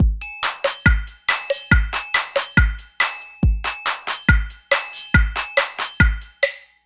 Music > Multiple instruments
Short Quirky Song - Multiple instruments
A Short Quirky Song I made in FL Studio. Reminds me of a song you'd hear in a short commercial.
Instrumental, Multisample, Quirky